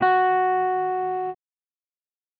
Instrument samples > String
Random guitar notes 001 FIS4 08
guitar, stratocaster, electricguitar, electric